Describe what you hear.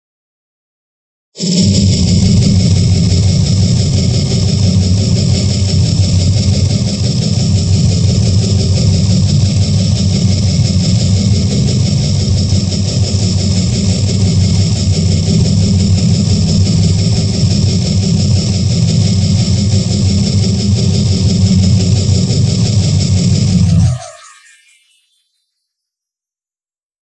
Music > Solo percussion
Simple Bass Drum and Snare Pattern with Weirdness Added 035
Snare-Drum, FX-Laden, Simple-Drum-Pattern, FX-Drum-Pattern, Experiments-on-Drum-Beats, Noisy, Experimental-Production, Experimental, Silly, FX-Laden-Simple-Drum-Pattern, Four-Over-Four-Pattern, Bass-and-Snare, Bass-Drum, Fun, Experiments-on-Drum-Patterns, FX-Drum, FX-Drums, Interesting-Results, Glitchy